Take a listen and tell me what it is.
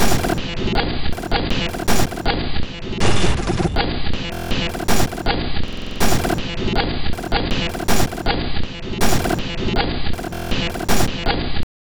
Instrument samples > Percussion
This 160bpm Drum Loop is good for composing Industrial/Electronic/Ambient songs or using as soundtrack to a sci-fi/suspense/horror indie game or short film.
Ambient, Soundtrack, Underground, Loopable, Samples, Alien, Drum, Weird, Packs, Dark, Loop, Industrial